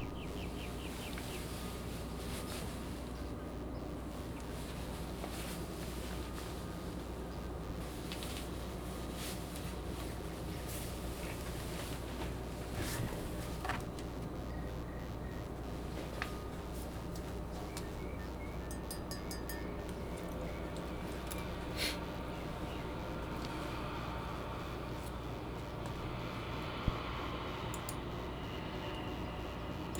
Soundscapes > Indoors
Working at office with open window
ambiance, click, computer, keyboard, mouse, office, typing